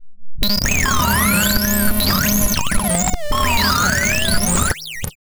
Sound effects > Electronic / Design
Optical Theremin 6 Osc Destroyed-024
Electro, Glitchy, Instrument, Noise, Robotic, Synth